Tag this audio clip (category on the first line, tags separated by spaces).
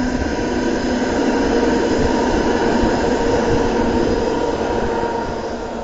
Soundscapes > Urban
city traffic tram trolley urban